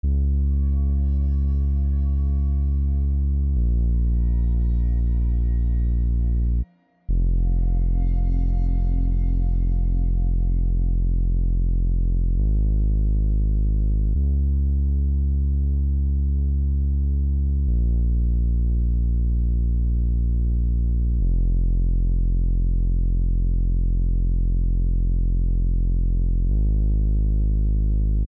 Instrument samples > Synths / Electronic
Baix reese amb textures
Long Synth Bass with texture
TONAL, TEXTURE, BASS